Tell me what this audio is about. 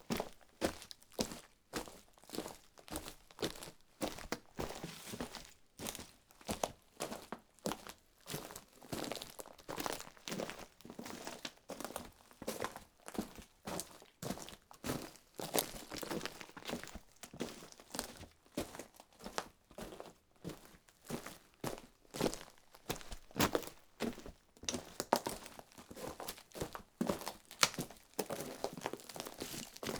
Sound effects > Human sounds and actions
Warlking on dry bark crunch (woody gravel) XY 3
Subject : Hand held recording of me walking on some tree bark and dusty ground in a barn where we keep the wood. Date YMD : 2025 04 22 Location : Gergueil Indoor, inside a barn where we keep the wood. Hardware : Tascam FR-AV2 Rode NT5 XY mode. Weather : Processing : Trimmed and Normalized in Audacity.
Tascam, NT5, step, bark, woody-gravel, wood, Walking, indoors, foot, FR-AV2, Rode, barn, foot-steps, footsteps, XY, woody, walk